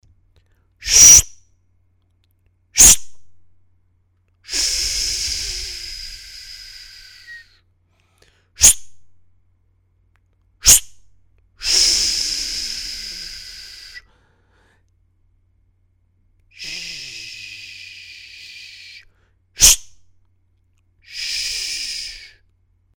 Speech > Other
shut up sound
chiu, shut, silent